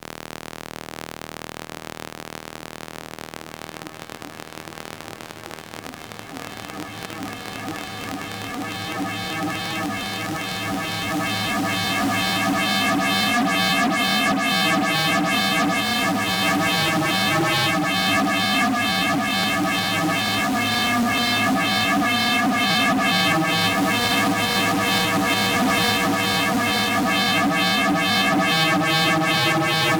Sound effects > Experimental
SomaEther Clock
Some electronic Noises, recorded with a Soma Ether V2, near my Wallclock. Editing: EQ and Compression.
Ether, Buzz, Noise, Sci-Fi, Static, Electric, Sound-Design, Hum, Glitch, Weird, Soma